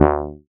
Instrument samples > Synths / Electronic
MEOWBASS 2 Gb
fm-synthesis, additive-synthesis, bass